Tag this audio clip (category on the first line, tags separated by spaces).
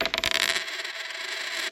Sound effects > Objects / House appliances
drop foley penny Phone-recording spin